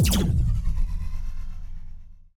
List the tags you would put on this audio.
Sound effects > Electronic / Design
energy futuristic plasma pulse Sci-fi Synthetic weapon

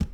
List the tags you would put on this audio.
Sound effects > Objects / House appliances
knock spill kitchen handle container water hollow garden drop metal carry pail household pour debris tip bucket clang tool scoop liquid lid cleaning plastic foley object

